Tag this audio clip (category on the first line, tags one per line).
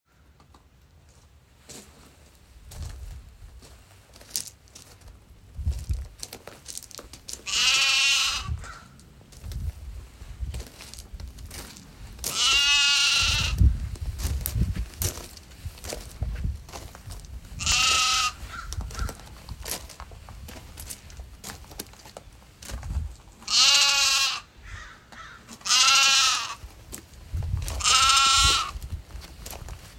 Soundscapes > Nature
farming
agriculture
countryside
pasture
country
field
land
farming-land
baby-lamb
farm
rural
agricultural
landscape